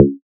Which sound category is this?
Instrument samples > Synths / Electronic